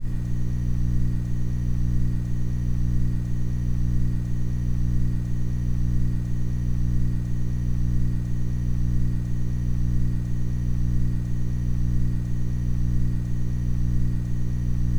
Sound effects > Electronic / Design
static loop short
Shotgun mic to an amp. feedback.
interference, radio, shortwave, static